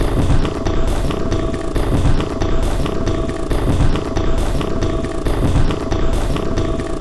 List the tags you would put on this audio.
Instrument samples > Percussion
Industrial Loopable Packs Underground

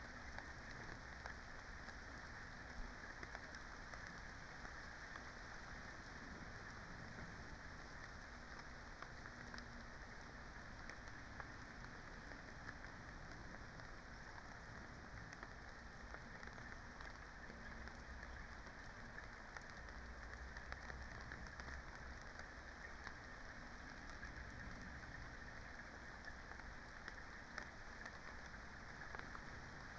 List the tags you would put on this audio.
Soundscapes > Nature
weather-data field-recording nature modified-soundscape alice-holt-forest sound-installation soundscape raspberry-pi natural-soundscape data-to-sound Dendrophone phenological-recording artistic-intervention

